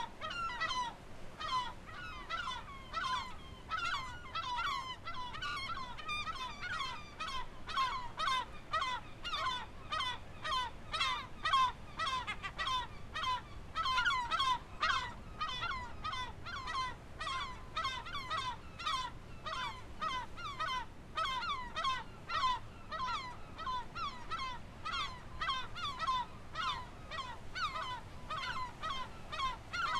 Soundscapes > Nature
BIRDSea Angry kelp gulls flying around their nests, wind and waves on Omaui Island, New Zealand
Recorded 08:37 04/01/26 Though I am placed on the rocky shore, the kelp gulls do not seem happy about my visit, since they have several nests on the central vegetation of the island. They fly above and caw, while some land on nearby rocks to keep an eye on me. Meanwhile the wind blows, resulting in moderate waves. Zoom H5 recorder, track length cut otherwise unedited.
Angry, Waves, Sea, Rocky, Field-Recording, Kelp, Laughing, Windy, Island, Morning, Zealand